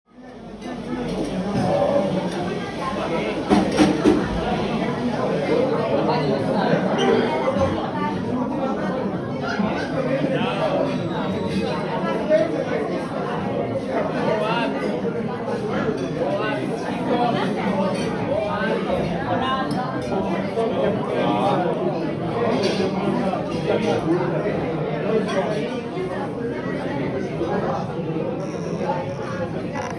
Soundscapes > Indoors
Genova Restaurant
The chaos in an italian restaurant, at prime time. Talking, guests ordering foot, new guests are arriving. "Buon giorno"